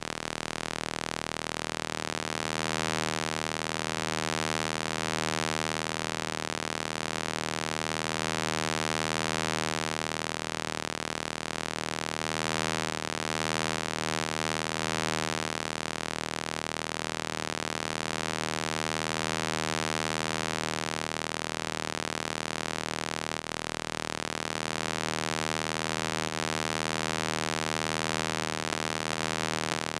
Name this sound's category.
Sound effects > Experimental